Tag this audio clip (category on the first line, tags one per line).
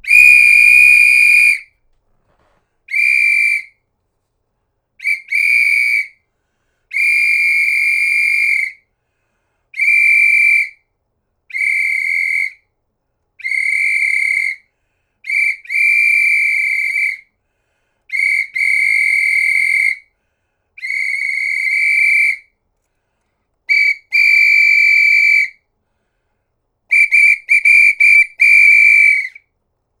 Sound effects > Objects / House appliances
toy
football
american-football
pea
Blue-Snowball
plastic
Blue-brand
blow
soccer
association-football
whistle
sports
parade
police